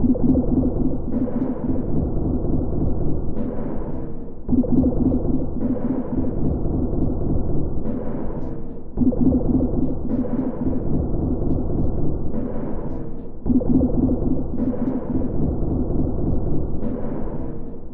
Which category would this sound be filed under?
Soundscapes > Synthetic / Artificial